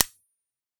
Sound effects > Other mechanisms, engines, machines
Circuit breaker switch-002
It's a circuit breaker's switch, as simple as that. Follow my social media please, I'm begging..